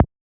Instrument samples > Synths / Electronic
A short kick one-shot made in Surge XT, using FM Synthesis.
electronic, surge